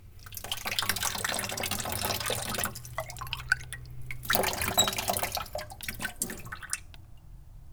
Sound effects > Objects / House appliances
industrial sink water foley-001
bonk, clunk, drill, fieldrecording, foley, foundobject, fx, glass, hit, industrial, mechanical, metal, natural, object, oneshot, perc, percussion, sfx, stab